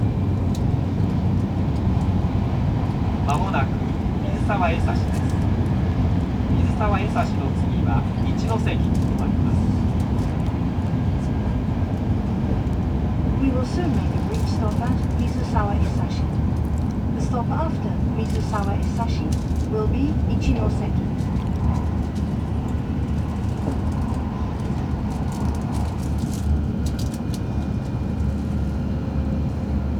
Sound effects > Vehicles
Recording Description: Yamabiko Arrival at Mizusawa-Esashi Macro A documentary-style field recording of a Tohoku Shinkansen interior during its approach to Mizusawa-Esashi Station. The recording captures the characteristic sonic environment of a Japanese high-speed rail journey, specifically focusing on the transition from high-speed travel to a stationary platform arrival. It serves as a clean acoustic snapshot of the E5/H5 series passenger experience. Meso The recording is defined by the contrast between the continuous, low-frequency white noise of the wind and track and the clear, melodic interventions of the train's systems. It opens with the dual-language (Japanese then English) automated announcement informing passengers of the upcoming stop and the subsequent station, Ichinoseki. Throughout the duration, the faint but distinct "clatter" of track joins and the steady hum of the ventilation system remain present as the train decelerates. Technical .
train, shinkansen, japan, announcement